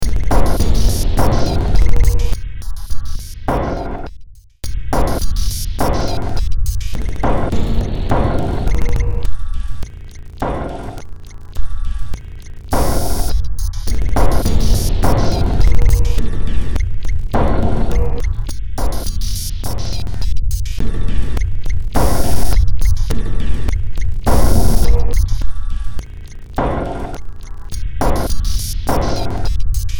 Music > Multiple instruments
Demo Track #3706 (Industraumatic)
Soundtrack, Ambient, Horror, Games, Noise, Cyberpunk, Sci-fi, Underground, Industrial